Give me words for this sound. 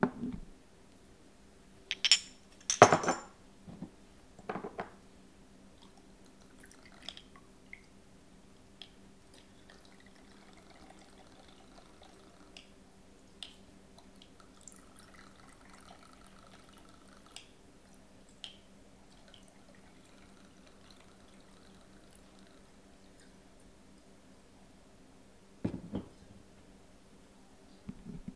Sound effects > Objects / House appliances
3 shot glasses set down. 3 shots poured from a bottle with spout. Bottle set down.